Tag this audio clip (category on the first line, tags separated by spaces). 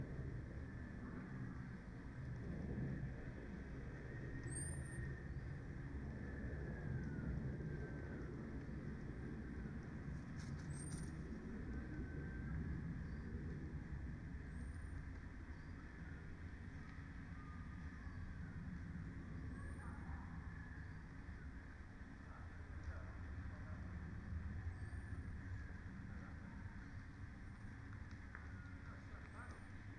Soundscapes > Nature
artistic-intervention alice-holt-forest Dendrophone field-recording sound-installation nature weather-data data-to-sound modified-soundscape phenological-recording raspberry-pi natural-soundscape soundscape